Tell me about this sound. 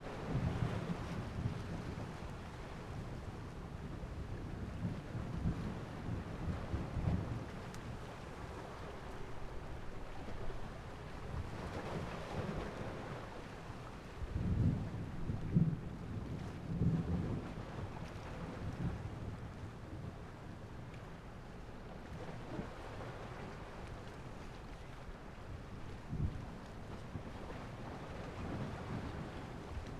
Soundscapes > Nature
Soundscape recorded at the village of Santa Maria Salina on the island of Salina. Ominous clouds loom above and cover the island's volcanoes. The sounds of waves crashing on the marina breakwater can be heard. The blowing of the wind and several thunderclaps in the distance. Recorded date: 18/06/2025 at 15:47 with: Zoom H1n with windscreen. Processing: no processing